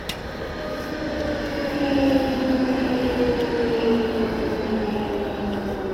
Sound effects > Vehicles
Tram 2025-10-27 klo 20.13.02
Sound recording of a tram approaching and decelerating. Recording done in Hervanta, Finland near the tram line. Sound recorded with OnePlus 13 phone. Sound was recorded to be used as data for a binary sound classifier (classifying between a tram and a car).
Public-transport, Tram, Finland